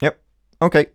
Speech > Solo speech
Relief - Yep okay
Human, Vocal, Voice-acting